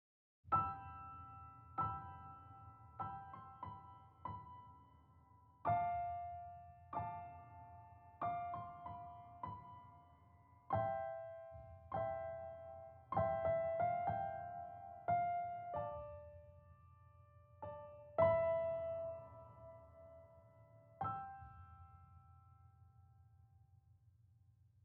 Music > Solo instrument
crackers and cheese piano
addictive keys with d verb piano. free use.